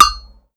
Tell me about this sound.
Music > Solo percussion
MUSCTnprc-Blue Snowball Microphone, CU Agogo Bells, Muted, Single Low Note Nicholas Judy TDC
A single, low muted agogo bell note.